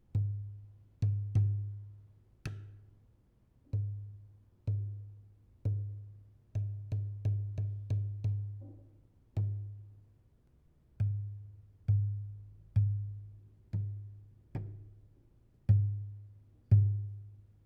Sound effects > Objects / House appliances
Tapping finger in a Listerine plastic bottle, resulting into some nice bass tones. Recorded with Zoom H2.